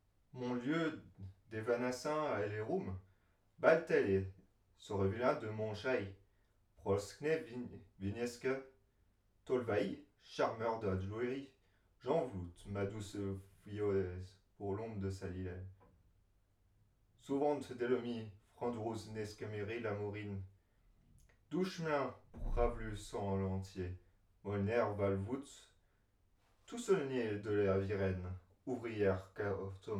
Speech > Other
Mumbo Jumbo 9 Frenchlike
XY, mumbling, French-like, Rode, unintelligible, mumbo, NT5, indoor, talking, solo-crowd, FR-AV2, Mumble, Tascam